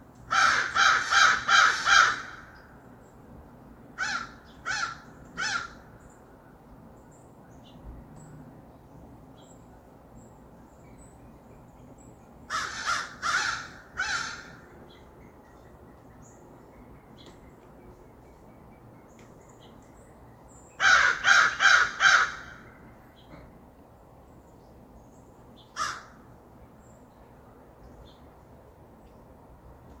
Sound effects > Animals
A flock of angry crows cawing from treetop to treetop.